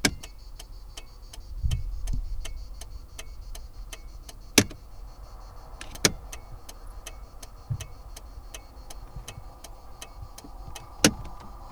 Sound effects > Vehicles
Ford 115 T350 - Turn indicator
August, Old, 115, Ford, FR-AV2, A2WS, 2025, SM57, Single-mic-mono, France, 2003, 2003-model, Van, Ford-Transit, T350, Tascam, Mono, Vehicle